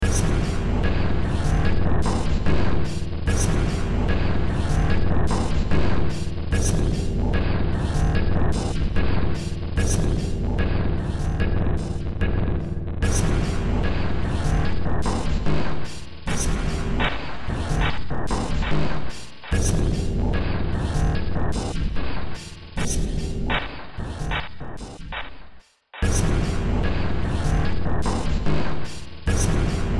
Music > Multiple instruments
Short Track #3980 (Industraumatic)
Ambient, Cyberpunk, Games, Horror, Industrial, Noise, Sci-fi, Soundtrack, Underground